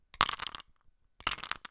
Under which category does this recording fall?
Sound effects > Other